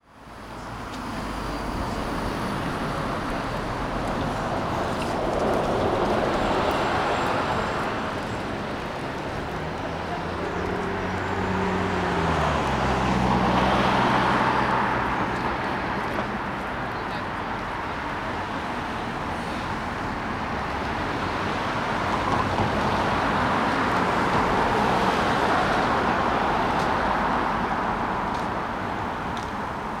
Soundscapes > Urban
High Street Evening Traffic
High street traffic, recorded on a sunny Saturday evening in spring. Cars, bustle, even some shouting.